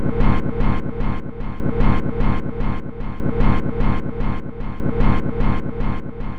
Instrument samples > Percussion
This 150bpm Drum Loop is good for composing Industrial/Electronic/Ambient songs or using as soundtrack to a sci-fi/suspense/horror indie game or short film.
Loop, Soundtrack, Loopable, Samples, Industrial, Packs, Alien, Weird, Drum, Underground, Ambient, Dark